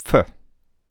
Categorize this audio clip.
Speech > Solo speech